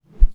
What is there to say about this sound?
Sound effects > Natural elements and explosions
Stick - Whoosh 10 (Teleport)
whosh, Swing, FR-AV2, swinging, fast, SFX, Rode, NT5, Transition, tascam, whoosh, teleport, Woosh, stick